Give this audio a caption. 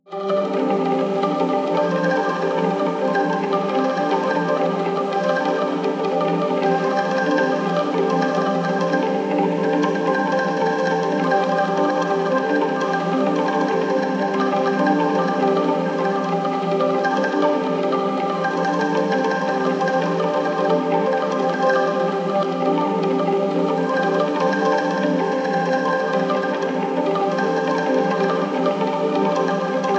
Soundscapes > Synthetic / Artificial
Processed with Khs Slice EQ, Khs Convolver, Vocodex, ZL EQ, Fruity limiter.